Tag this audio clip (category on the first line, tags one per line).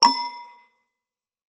Sound effects > Electronic / Design
game
interface
ui